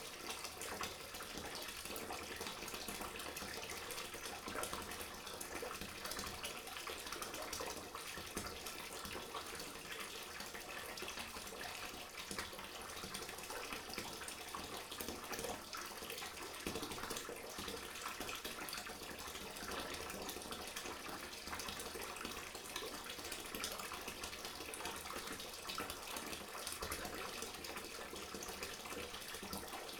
Sound effects > Objects / House appliances
Bath tap running Loop
Bath running in a tiled bathroom.
Running, Bathroom, Bath, Water, Tub, Tiles, Tap